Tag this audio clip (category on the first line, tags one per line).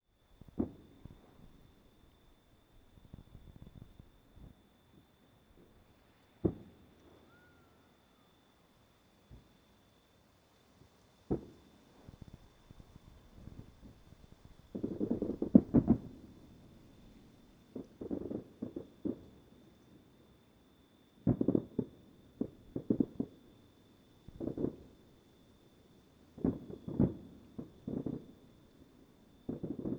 Soundscapes > Other
explosion
soundscape
fireworks